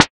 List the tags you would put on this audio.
Instrument samples > Synths / Electronic
additive-synthesis
fm-synthesis
bass

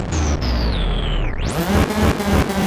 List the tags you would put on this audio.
Music > Other
industrial
loop
120bpm
techno
Ableton
soundtrack
chaos

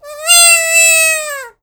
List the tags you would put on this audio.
Sound effects > Objects / House appliances
squeak
doll
Blue-brand
Blue-Snowball

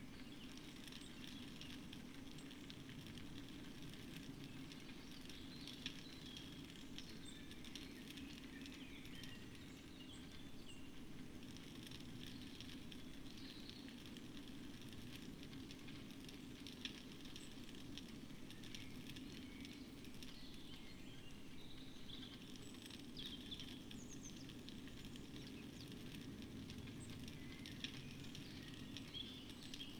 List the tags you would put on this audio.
Soundscapes > Nature
nature
sound-installation
modified-soundscape
Dendrophone
weather-data
data-to-sound
alice-holt-forest
natural-soundscape
phenological-recording
field-recording
artistic-intervention
raspberry-pi
soundscape

